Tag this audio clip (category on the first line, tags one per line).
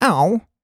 Speech > Solo speech
2025
Adult
AW
Aww
Calm
FR-AV2
Generic-lines
hurt
Hypercardioid
july
Male
mid-20s
MKE-600
MKE600
OW
pain
Sennheiser
Shotgun-mic
Shotgun-microphone
Single-mic-mono
Tascam
VA
Voice-acting